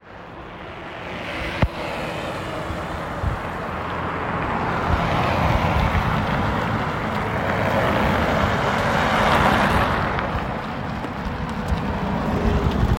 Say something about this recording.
Soundscapes > Urban
Car passsing Recording 14
The sound originates from a passenger car in motion, generated by the engine and tire–road interaction. It consists of continuous engine noise and tire friction, with a noticeable Doppler change as the car approaches and passes the recording position. The sound was recorded on a residential street in Hervanta, Tampere, using a recorder in iPhone 12 Pro Max. The recording is intended for a university audio processing project, suitable for simple analysis of pass-by sounds and spectral changes over time.